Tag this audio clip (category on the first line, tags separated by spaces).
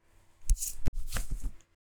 Sound effects > Human sounds and actions
attack fight foley grab hand scuffle